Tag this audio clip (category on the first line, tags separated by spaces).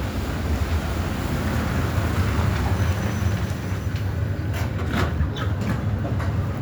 Sound effects > Vehicles
transportation bus